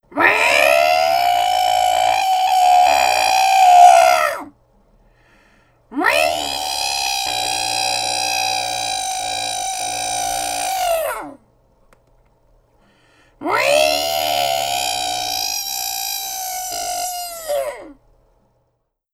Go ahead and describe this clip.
Sound effects > Animals
TOONAnml-Blue Snowball Microphone, CU Cat, Screech, Human Imitation Nicholas Judy TDC
A screeching cat. Human imitation.
Blue-brand, Blue-Snowball, cartoon, cat, human, imitation, screech